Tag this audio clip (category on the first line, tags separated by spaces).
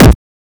Instrument samples > Percussion
BrazilFunk Distorted EDM kick